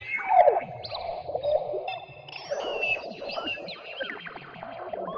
Soundscapes > Synthetic / Artificial
LFO Birdsong 51
Birdsong, LFO, massive